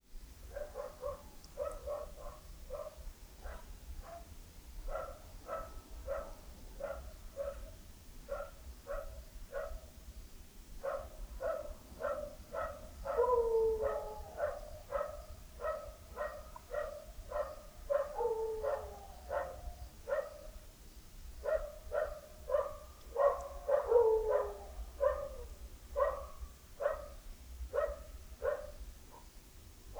Soundscapes > Nature
dogs barking at night
one, then two, then several dogs are barking faraway by night.